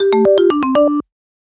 Music > Other
Simple synthy motif. Produced on a Korg Wavestate, mastered at -3dBu in Pro Tools.